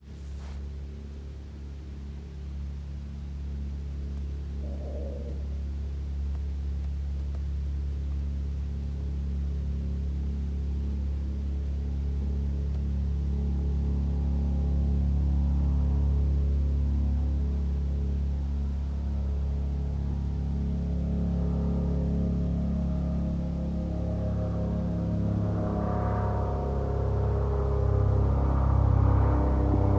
Sound effects > Vehicles
Distant Helicopter Passes By
Sound of distant helicopter passing by. Low drone with slight doppler effect.
ambulance, copter, flight, doppler, rotor, police, heli, flying, helicopter, chopper